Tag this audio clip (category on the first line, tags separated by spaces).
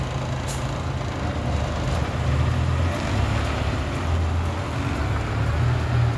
Sound effects > Vehicles
bus transportation vehicle